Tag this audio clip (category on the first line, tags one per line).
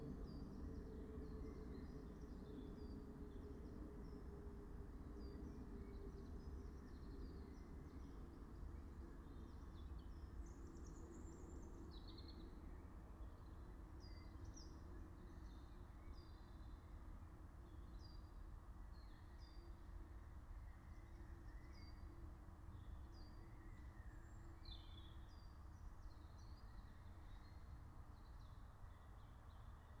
Soundscapes > Nature
alice-holt-forest
natural-soundscape
nature
phenological-recording